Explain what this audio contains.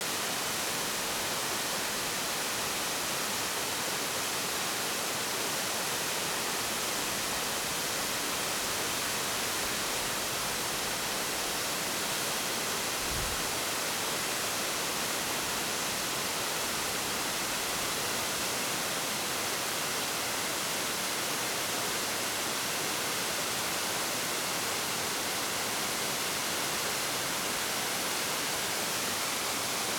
Soundscapes > Nature
Waterfall on International Falls trail
Slightly downstream and facing the largest drop on a series of waterfalls along the International Falls trail near the White Pass summit at the Canada-US border. Recorded on a Zoom H2n in 90-degree stereo mode.
alaska, field-recording, hiking-trail, international-falls, river, skagway, south-klondike-highway, water, waterfall, white-pass-summit